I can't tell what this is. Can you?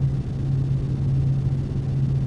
Sound effects > Objects / House appliances
computer on loop1
ambient, computer, mechanical, pc